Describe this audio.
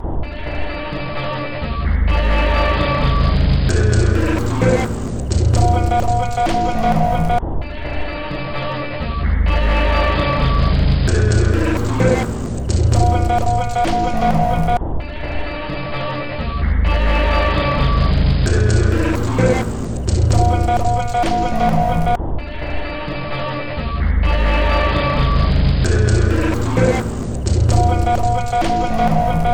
Instrument samples > Percussion
This 65bpm Drum Loop is good for composing Industrial/Electronic/Ambient songs or using as soundtrack to a sci-fi/suspense/horror indie game or short film.
Underground, Ambient, Alien, Packs, Loop, Soundtrack, Industrial, Weird, Drum, Dark, Samples, Loopable